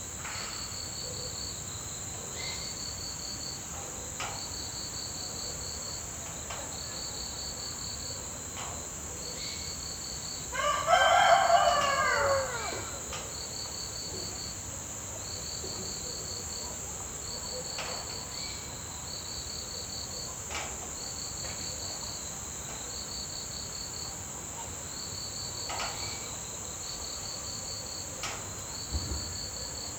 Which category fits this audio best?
Soundscapes > Other